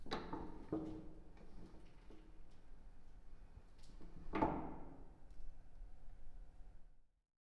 Sound effects > Objects / House appliances
Bomb shelter door locking mechanism 1
In the basement of our apartment building, there is a bomb shelter with heavy metal doors, kind of like submarine doors. This is the sound of its locking mechanism.
closing, door, doors, heavy, metal, opening, shelter